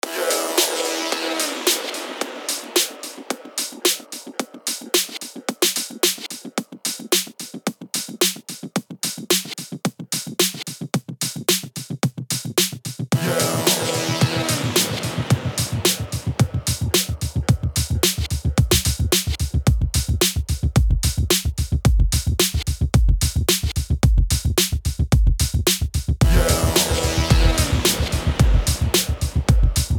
Music > Multiple instruments
Drums +Fx
Ableton Live. VST.Fury-800.......Musical Composition Free Music Slap House Dance EDM Loop Electro Clap Drums Kick Drum Snare Bass Dance Club Psytrance Drumroll Trance Sample .